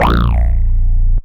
Instrument samples > Synths / Electronic
CVLT BASS 67
bass, bassdrop, clear, drops, lfo, low, lowend, stabs, sub, subbass, subs, subwoofer, synth, synthbass, wavetable, wobble